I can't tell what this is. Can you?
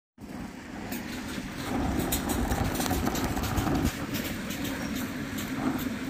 Sound effects > Vehicles
final bus 35
Bus Sound captured on iphone 15 Pro.
bus, finland, hervanta